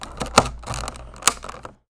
Sound effects > Objects / House appliances
OBJCont-Blue Snowball Microphone, CU The Cheesecake Factory Tray, Close Nicholas Judy TDC
The Cheesecake Factory tray closing.
tray, Blue-Snowball